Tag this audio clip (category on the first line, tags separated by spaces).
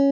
Instrument samples > String
arpeggio design guitar cheap stratocaster tone sound